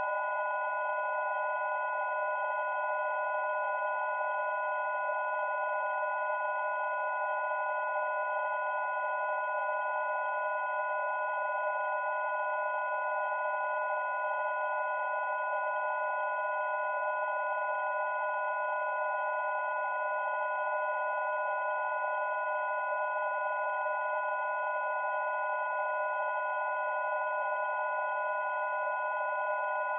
Sound effects > Electronic / Design
Drone inarmónico campana
Non-harmonic sound made with the "Ambience Match" tool in RX.
bell, drone, pad, artificial, rx, campana, inarmonico, experimental